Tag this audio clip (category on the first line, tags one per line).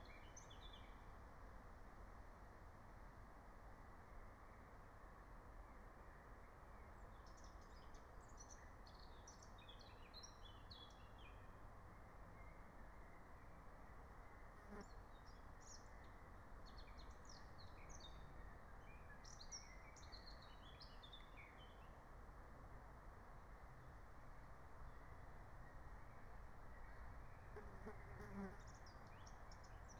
Soundscapes > Nature
nature
meadow
raspberry-pi
alice-holt-forest
natural-soundscape
phenological-recording
soundscape
field-recording